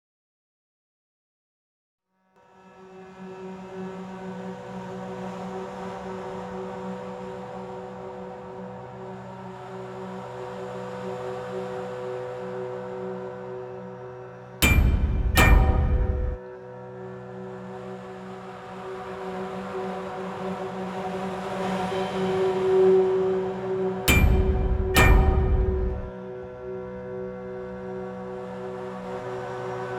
Music > Multiple instruments
Klingon Opera, Long
Low drones are periodically interrupted by hammered drums and the clashing of steel pipes. Heavy rhythmic drumming interrupts then stops suddenly.
banging, percussive, music, musical-intro, hammered-metal, exciting, thunderous, drums, drones